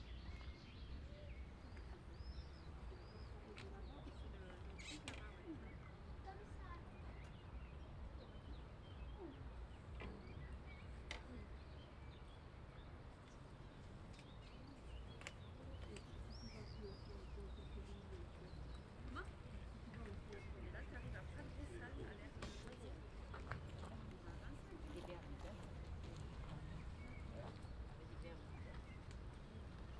Soundscapes > Urban
Subject : Ambience of the newly built bridge connecting the Cathedral and Pratgraussal sitting near the middle facing east. Quite a few people due to it being recently opened (near the 28th of may) Along with a Triathlon event going on. Date YMD : 2025 06 09 (Monday) Location : Pratgraussal Albi 81000 Tarn Occitanie France. Outdoors Hardware : Tascam FR-AV2, Soundman OKM1 Binaural in ear microphones. Weather : Clear sky 24°c ish, little to no wind. Processing : Trimmed in Audacity. Probably a 40hz 12db per octave HPF applied. (Check metadata) Notes : That day, there was a triathlon going on.